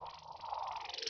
Soundscapes > Synthetic / Artificial
Description on master track